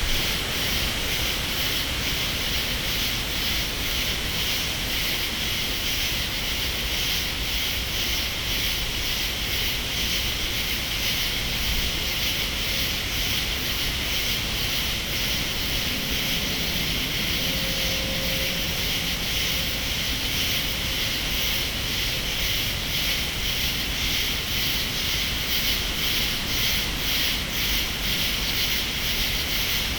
Soundscapes > Urban
AMBRurl-Summer Night, cicadas, distant thunder, 11PM QCF Trussville Alabama iPhone SE2 with Rode i-XY
A late summer night in a wooded neighborhood, Central Alabama. Cicadas, distant thunder.
Summer, cicadas, night, insects, field-recording, thunder